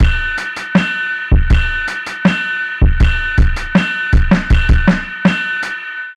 Music > Multiple instruments
distorted drums 80 bpm
Simple drum loop made with bandlab.i used some distortion on a drum loop i made 80 bpm
Hat, Hi-Hats, Distortion, Snare, Drum, Kick